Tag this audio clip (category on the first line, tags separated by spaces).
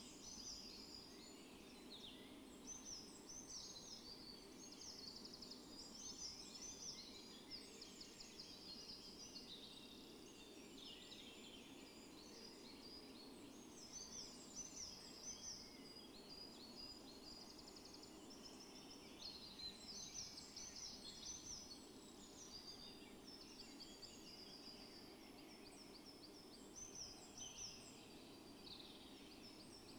Soundscapes > Nature
soundscape Dendrophone alice-holt-forest field-recording weather-data natural-soundscape sound-installation nature data-to-sound raspberry-pi modified-soundscape phenological-recording artistic-intervention